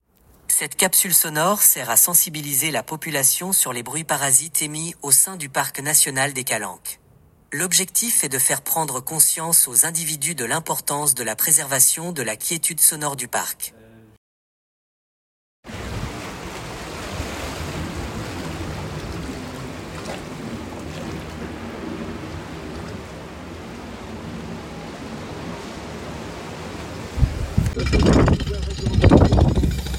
Soundscapes > Nature
Capsule Sonore
2-minutes long sound capsule about Calanques National Park.
nature, park, Sound